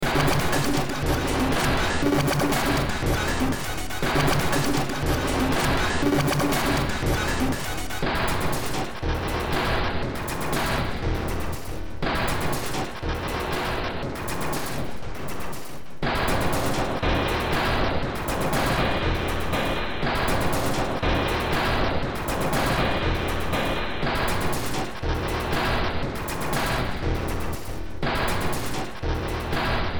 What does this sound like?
Music > Multiple instruments
Demo Track #3849 (Industraumatic)
Ambient, Games, Horror, Underground, Cyberpunk, Sci-fi, Soundtrack, Industrial, Noise